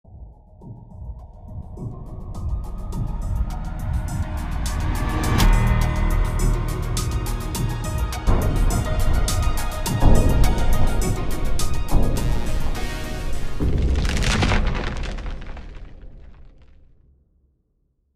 Music > Multiple instruments
Trailer Jingle #1
I modified it to attempt to give it a better sense of build.
movie-trailer, trailer-music, trailer-theme, cinematic-trailer, logo-animation-theme, logo-theme, cinematic-intro, movie-trailer-theme, trailer, reveal-music, sci-fi, reveal-theme